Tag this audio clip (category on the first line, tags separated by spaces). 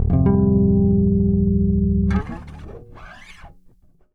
Music > Solo instrument
rock harmonics notes lowend